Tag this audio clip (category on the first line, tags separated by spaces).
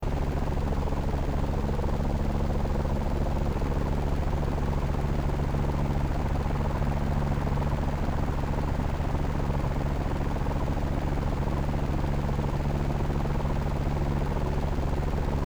Sound effects > Vehicles
rotor helicopter engine blades chopper motor